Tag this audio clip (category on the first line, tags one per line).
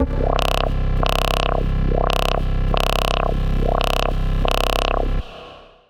Instrument samples > Synths / Electronic
drops
bass
subs
lowend
synthbass
subbass
subwoofer
clear
stabs
wavetable
sub
bassdrop
wobble
low
lfo
synth